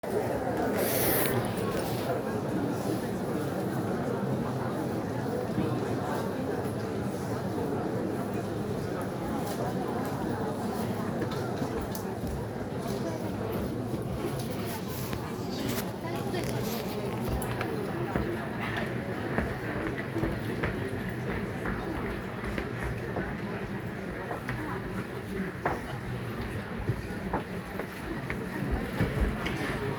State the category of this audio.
Soundscapes > Indoors